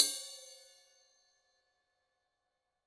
Music > Solo instrument
Shrill CYmbal Perc
Cymbals, Oneshot, Drum, Paiste, Metal, Hat, Ride, Drums, FX, Cymbal, Percussion, GONG, Kit, Perc, Sabian, Crash, Custom